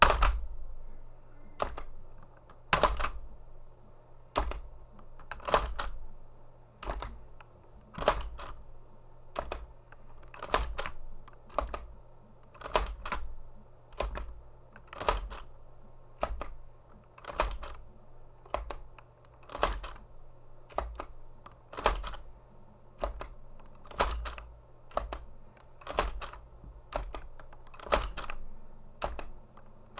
Sound effects > Objects / House appliances
A Rock Em' Sock Em' robot jaw being punched.